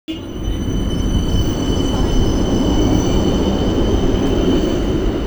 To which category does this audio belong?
Sound effects > Vehicles